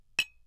Sound effects > Objects / House appliances
Bottle Clink 4
glass, clink, bottle, oneshot